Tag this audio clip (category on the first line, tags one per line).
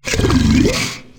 Sound effects > Experimental
Creature
mouth
Alien
bite
zombie
grotesque
snarl
fx
Monster
weird
otherworldly
growl
howl
Sfx
gross
demon
dripping
devil